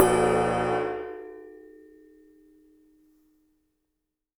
Music > Solo instrument
Paiste 22 Inch Custom Ride-015
22inch, Custom, Cymbal, Cymbals, Drum, Drums, Kit, Metal, Oneshot, Paiste, Perc, Percussion, Ride